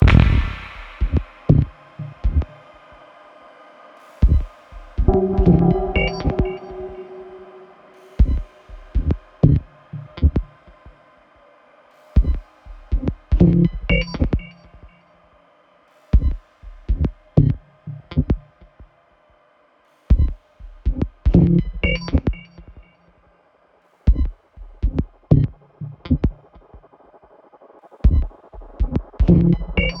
Instrument samples > Synths / Electronic
I synthesize sounds, textures, rhythmic patterns in ableton. Use it and get high.✩♬₊˚. These are sounds from my old synthesis sketches.
electronic, glitch, loop, minimal, sound, sound-design